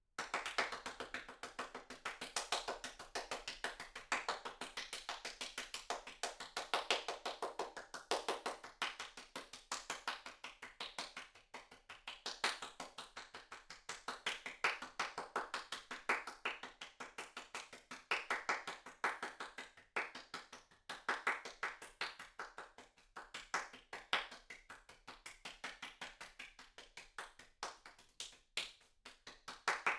Sound effects > Human sounds and actions
A series of me recording multiple takes in a medium sized bedroom to fake a crowd. Clapping/talking and more original applause types, at different positions in the room. Recorded with a Rode NT5 XY pair (next to the wall) and a Tascam FR-AV2. You will find most of the takes in the pack.
Applaud, Applauding, Applause, AV2, clap, clapping, FR-AV2, individual, indoor, NT5, person, Rode, solo, Solo-crowd, Tascam, XY